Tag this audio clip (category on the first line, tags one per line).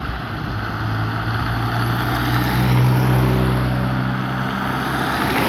Soundscapes > Urban
car
engine
vehicle